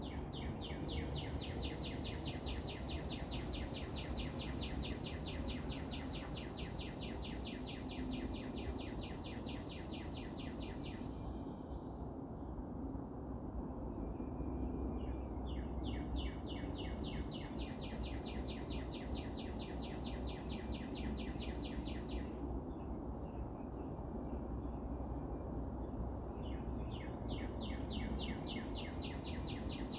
Soundscapes > Nature
A northern cardinal sings amongst other birds.